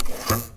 Sound effects > Other mechanisms, engines, machines
Woodshop Foley-027
bam, bang, boom, bop, crackle, foley, fx, knock, little, metal, oneshot, perc, percussion, pop, rustle, sfx, shop, sound, strike, thud, tink, tools, wood